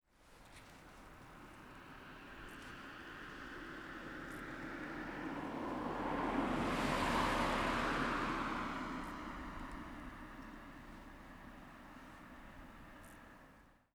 Soundscapes > Nature
Single car driving past, moderate speed, recorded near the roadside.
car, drive, passing, road, street, traffic, transport, vehicle